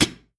Sound effects > Objects / House appliances
METLHndl Kettle Top, Open Nicholas Judy TDC
A kettle top opening.